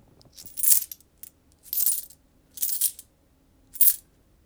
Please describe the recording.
Sound effects > Objects / House appliances
Sound of coins. Recorded with Fifine K669 for gamedev projects.
cash, coin, coins, money